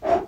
Sound effects > Natural elements and explosions
Whoosh in
The sound of a whoosh of air coming towards the listener
whoosh, air, blow